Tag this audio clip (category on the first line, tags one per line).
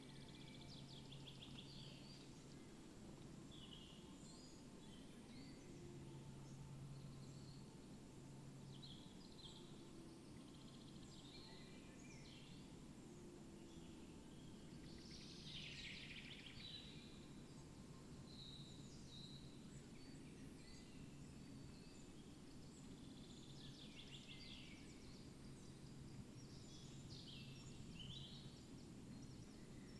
Soundscapes > Nature
nature
natural-soundscape
phenological-recording
modified-soundscape
Dendrophone
soundscape
field-recording
weather-data
alice-holt-forest
artistic-intervention
raspberry-pi
sound-installation
data-to-sound